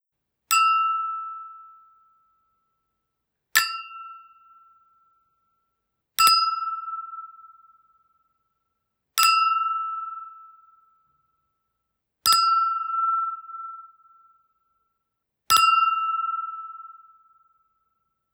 Sound effects > Objects / House appliances
Glass - Cheers Cristal
Two glasses of fine cristal being hit, like a toast during a reception. Gentle cheers. * No background noise. * No reverb nor echo. * Clean sound, close range. Recorded with Iphone or Thomann micro t.bone SC 420.